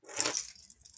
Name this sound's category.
Sound effects > Objects / House appliances